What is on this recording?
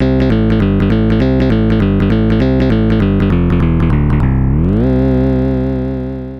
Music > Solo instrument
A synth picked bass i made. Music made in furnace tracker.
Furnace-tracker, Picked-bass